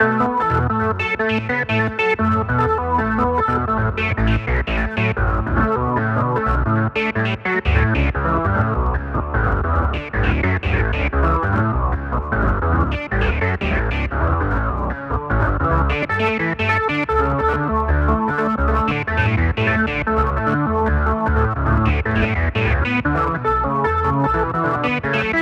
Music > Solo instrument
Drity Beach Party
bass beach party track made in albeton in live
ambiance, background-sound, general-noise